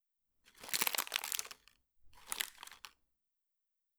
Sound effects > Objects / House appliances
Recorded sound of me playing with a chocolate truffle paper. Tascam DR-05.
opening, truffle, chocolate, paper, tascam-dr05